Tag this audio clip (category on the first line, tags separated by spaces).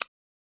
Sound effects > Objects / House appliances
tying satin-ribbon ribbon